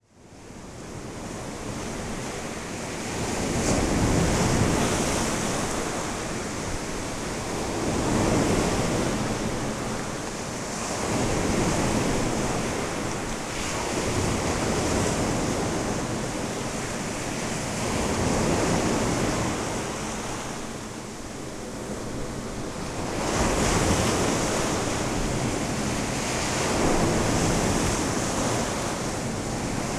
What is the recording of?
Soundscapes > Nature
250815 150205 PH Strong waves at Masasa beach binaural
Strong waves at Masasa Beach (binaural, please use headset for 3D effects). I made this binaural recording during a windy and wavy day, on a beautiful sand beach called Masasa beach, located in the south of Tingloy island, in Batangas province, Philippines. Hopefully, I found a nice place sheltered from the wind, ideal to record theses strong and big waves ! Recorded in August 2025 with a Zoom H5studio and Ohrwurm 3D binaural microphones. Fade in/out and high pass filter at 60Hz -6dB/oct applied in Audacity. (If you want to use this sound as a mono audio file, you may have to delete one channel to avoid phase issues).
ambience, atmosphere, beach, big, binaural, coast, crashing, field-recording, island, ocean, Philippines, sand, sea, seaside, shore, soundscape, splash, splashing, strong, surf, surfing, tingloy, water, waves